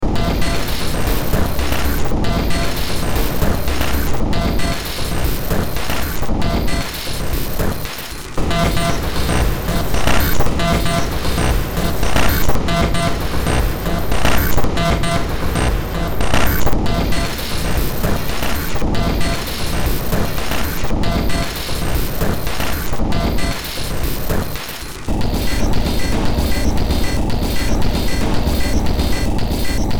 Music > Multiple instruments
Short Track #4044 (Industraumatic)
Soundtrack, Industrial, Noise, Ambient, Cyberpunk, Underground, Games, Sci-fi, Horror